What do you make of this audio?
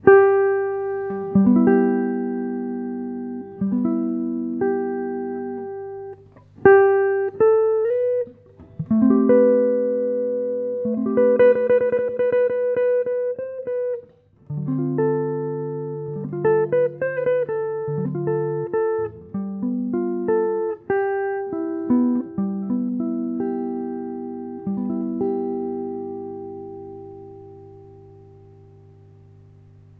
Music > Solo instrument
My amazing Eastman playing a turnaround in C. The amplifier is the historical Polytone Mini Brute and it was recorded with a Tascam Portacapture X6